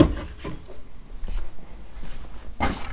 Sound effects > Other mechanisms, engines, machines
metal shop foley -063
a collection of foley and perc oneshots and sfx recorded in my workshop
sound, little, rustle, perc, thud, wood, strike, foley, bop, knock, oneshot, pop, crackle, tools, tink, boom, sfx, fx, bam, shop, metal, bang, percussion